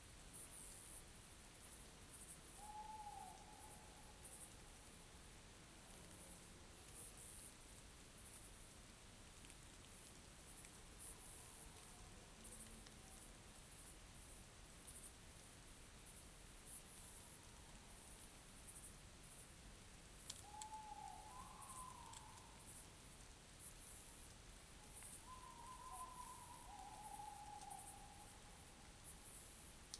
Sound effects > Animals
20250907 01h05 Gergueil D104 Forest - Distant Owl
Hardware : DJI Mic 3 TX. Onboard recorder "Original" / raw mode. With the stock wind-cover. Dangling from a piece of string up some branches. Weather : Processing : Trimmed and normalised in Audacity.
night, field-recording, country-side, Dji-Mic3, September, dji